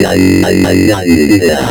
Music > Solo instrument
Made using filters, slew distortion, and bitcrushing.